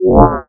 Instrument samples > Synths / Electronic
DISINTEGRATE 2 Gb
bass, additive-synthesis, fm-synthesis